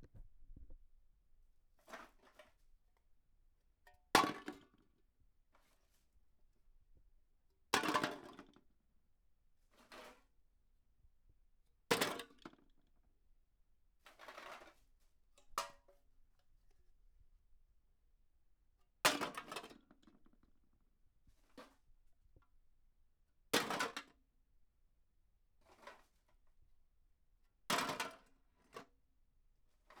Sound effects > Objects / House appliances
empty soda cans dropped in a plastic bucked
Many empty soda cans dropped into a plastic bucket. Recorded with Zoom H2.
dropped, empty